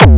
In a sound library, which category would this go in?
Instrument samples > Percussion